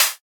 Instrument samples > Synths / Electronic
Hat Open-03
An open hi-hat one-shot made in Surge XT, using FM synthesis.
electronic, fm